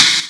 Instrument samples > Percussion
spock 18 inches Zildjian Soundlab Medium Thin Prototype - 19 inches Z3 China semilong
crunch, Sabian